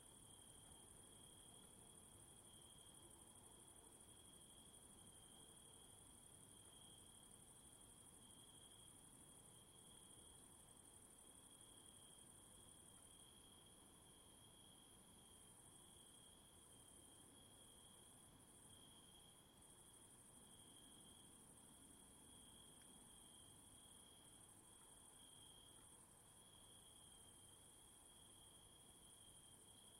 Soundscapes > Urban
July 27 2025 morning sounds of my garden recorded by Olympus WS852 stereo dictaphone.